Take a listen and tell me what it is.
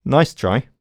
Speech > Solo speech
Cocky - Nice try 2
smug, talk, FR-AV2, Tascam, cocky, Video-game, voice, Human, singletake, Voice-acting, Vocal, Single-take, oneshot, Man, Mid-20s, U67, NPC, dialogue, words, Male, sentence, Neumann, sarcastic